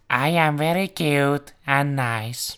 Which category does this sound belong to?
Speech > Solo speech